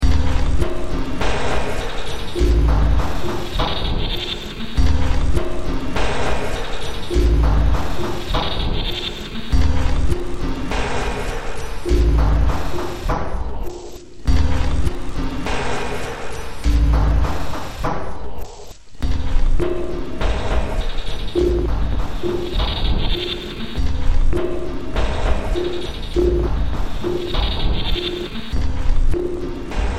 Music > Multiple instruments
Demo Track #3043 (Industraumatic)
Ambient, Cyberpunk, Games, Horror, Industrial, Noise, Sci-fi, Soundtrack, Underground